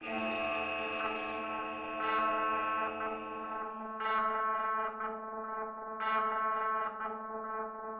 Sound effects > Electronic / Design
Angel's Bassoon
abstract, absynth